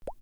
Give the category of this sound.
Sound effects > Other